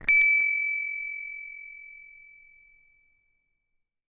Instrument samples > Percussion
Bell 4.5x5.5cm 1
Subject : A bell 4.5cm wide, 5.5cm tall. Date YMD : 2025 04 21 Location : Gergueil France. Hardware : Tascam FR-AV2 Rode NT5 microphone. Weather : Processing : Trimmed and Normalized in Audacity. Probably some Fade in/outs too.